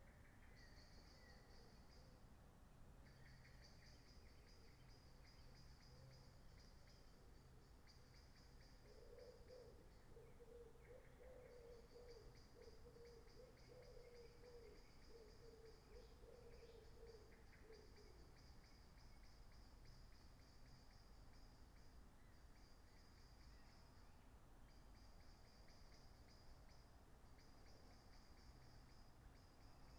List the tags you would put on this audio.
Soundscapes > Nature
modified-soundscape field-recording sound-installation raspberry-pi artistic-intervention natural-soundscape soundscape nature Dendrophone weather-data alice-holt-forest data-to-sound phenological-recording

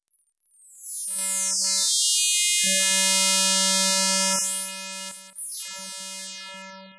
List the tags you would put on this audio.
Sound effects > Experimental
image-to-sound imagetosounds imagetosound

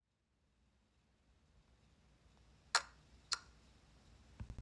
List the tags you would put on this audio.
Sound effects > Objects / House appliances
Casette Press Button

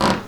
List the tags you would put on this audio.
Sound effects > Human sounds and actions
wood walking groan walk floorboards floor flooring creaky grind bare-foot rub old-building heavy wooden creaking footsteps squeaking squeak floorboard footstep squeaky grate screech hardwood squeal room going old scrape weight

